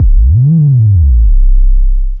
Instrument samples > Synths / Electronic
CVLT BASS 173
lowend, clear, low, wobble, bassdrop, stabs, synthbass, wavetable, drops, bass, subbass, sub, synth, subs, subwoofer, lfo